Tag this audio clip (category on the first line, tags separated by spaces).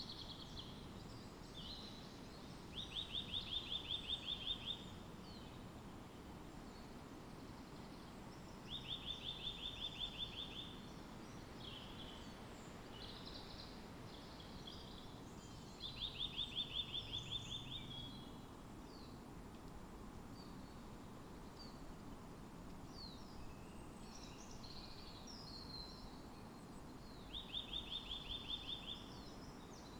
Soundscapes > Nature
natural-soundscape field-recording modified-soundscape sound-installation data-to-sound weather-data Dendrophone phenological-recording nature raspberry-pi